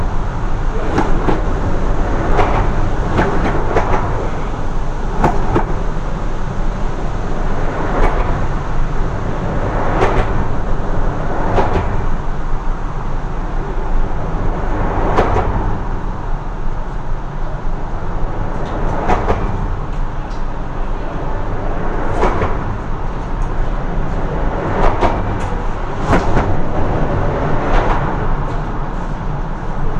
Soundscapes > Urban
Sound taken underneath bridge with cars hitting ramp as they cross.